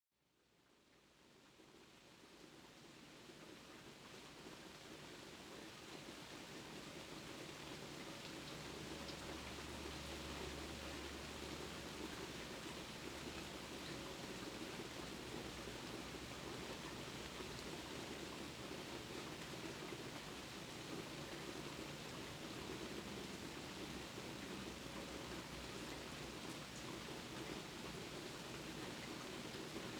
Soundscapes > Nature
Audio taken from thundershowers recorded from my condo's deck over a span of two days (6/30-7/1/25). The June recording had a mild thundershower with only one decent rumble of thunder and light rain. I used 7 1/2 minutes of that recording and I blended it in with a longer, and more eventful, thunderstorm taken the next day. That storm had a little more thunder, some wind driven rain, a few sounds of my rain soaked wind chimes. Recorded with a Zoom H-6 Essential recorder. Edited with AVS Audio software.